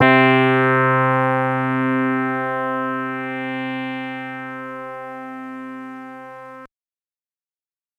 Instrument samples > Other
Trumpet C note for musical use. Made in famistudio